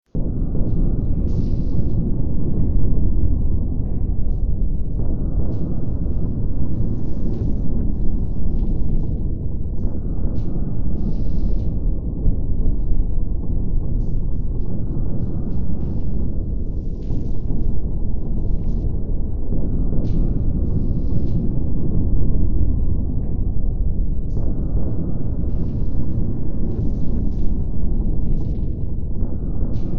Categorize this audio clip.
Soundscapes > Synthetic / Artificial